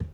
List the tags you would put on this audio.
Sound effects > Objects / House appliances
clatter clang pail plastic bucket scoop carry foley object drop slam fill debris hollow metal container shake knock cleaning

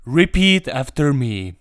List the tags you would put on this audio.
Speech > Solo speech
calm human male man repeat voice

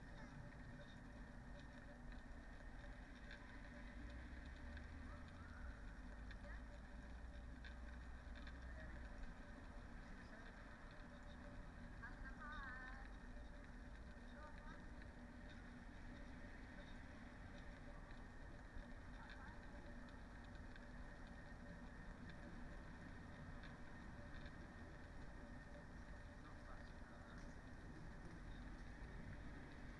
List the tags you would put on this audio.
Soundscapes > Nature
nature artistic-intervention natural-soundscape soundscape Dendrophone raspberry-pi data-to-sound modified-soundscape weather-data field-recording alice-holt-forest phenological-recording sound-installation

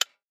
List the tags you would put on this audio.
Sound effects > Human sounds and actions
switch activation click button interface off toggle

